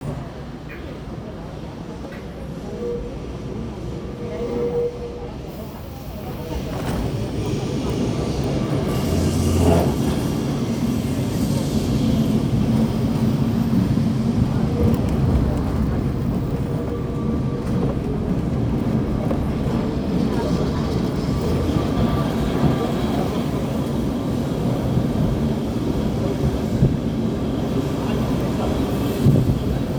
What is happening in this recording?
Soundscapes > Urban
tram 28 Lisbon 2 June 2024
Tram 28 in Lisbon, Portugal, in 2024. Tram proceeds, brakes and stops to let passengers in, and continues. Some wind noise heard. Door opens and closes in a couple places. In one place beeping is heard as passengers scan their fare cards. Recording device: Samsung smartphone.
Lisbon, public-transport, tram-28, streetcar, Portugal, tram